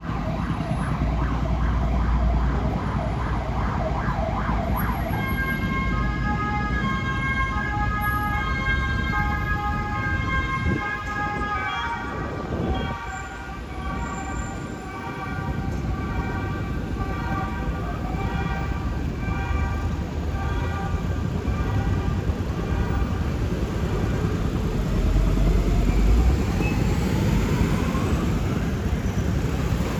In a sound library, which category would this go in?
Soundscapes > Urban